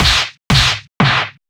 Instrument samples > Percussion
Phonk BeatBox Snare-3
A snare made with my mouth, recorded with my headphone's microphone Layerd samples from FLstudio original sample pack. Processed with Waveshaper, ZL EQ, ERA 6 De-Esser Pro.
Phonk, Snare, Beatbox